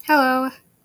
Speech > Solo speech
Hello Original
voice, greeting, talk